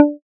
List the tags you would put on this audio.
Instrument samples > Synths / Electronic
additive-synthesis pluck

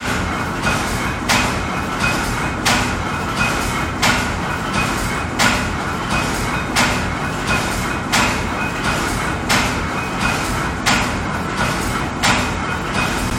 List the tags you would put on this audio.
Sound effects > Other mechanisms, engines, machines
Factory Industrial

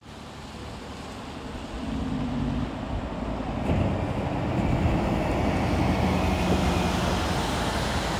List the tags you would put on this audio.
Soundscapes > Urban
bus transport vehicle

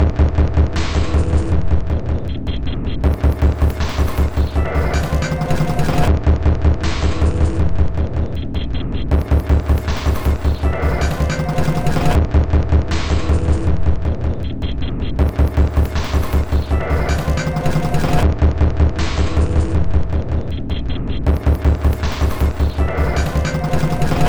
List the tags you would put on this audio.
Instrument samples > Percussion
Soundtrack Drum Samples Weird Loopable Loop Packs Dark Alien Ambient Underground Industrial

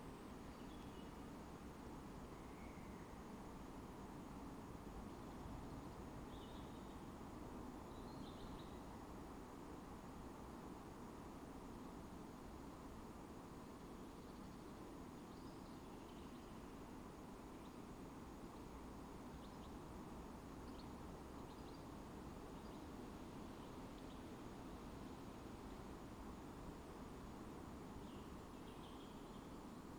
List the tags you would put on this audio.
Soundscapes > Nature
alice-holt-forest artistic-intervention data-to-sound modified-soundscape natural-soundscape nature raspberry-pi

